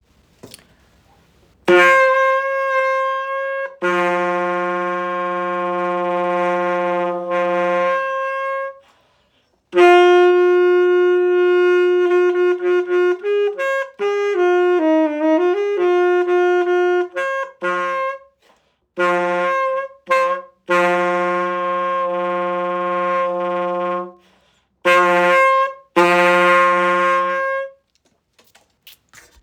Instrument samples > Wind
Strange Tenor Saxophone Tones
Recorded using my iPhone 13, these weird tenor saxophone sounds could be good for a soundscape, or as random samples in a song. IDK, I just thought they were neat.
bizarre, saxophone, tenor-saxophone